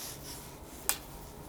Sound effects > Objects / House appliances
Coin Foley 9
coin foley coins change jingle tap jostle sfx fx percusion perc
change, coin, coins, foley, fx, jingle, jostle, perc, percusion, sfx, tap